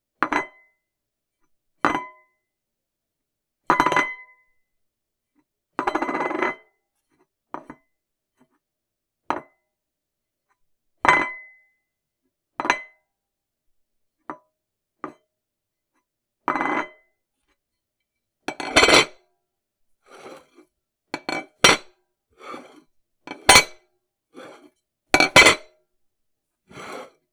Sound effects > Objects / House appliances
ceramic plate b
Picking up and dropping a ceramic plate on a countertop.
porcelain, plate, ceramic, impact, foley